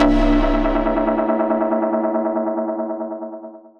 Instrument samples > Synths / Electronic
CVLT BASS 141
stabs, bassdrop, bass, subbass, drops, lowend, wavetable, synth, subs, low, sub, synthbass, subwoofer, clear, wobble, lfo